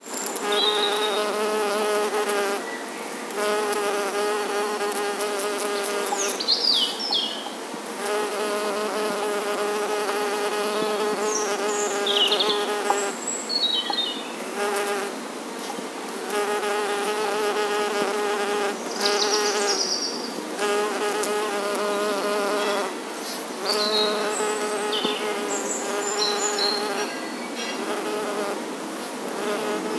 Sound effects > Natural elements and explosions
Bumble bee examining flowers
A bumble bee in a garden in south-east England looking for pollen at 5pm in April
insect, bumble, uk, garden, pollen, summer, nature, field-recording, bee